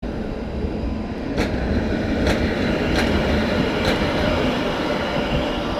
Sound effects > Vehicles
Sound recording of a tram passing by. While moving the tram travels over a unsmooth surface causing an audible thump multiple times. Recording done in Hervanta, Finland near the tram line. Sound recorded with OnePlus 13 phone. Sound was recorded to be used as data for a binary sound classifier (classifying between a tram and a car).
Finland, Public-transport, Tram